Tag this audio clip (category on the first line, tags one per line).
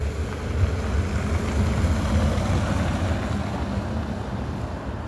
Sound effects > Vehicles
transportation
vehicle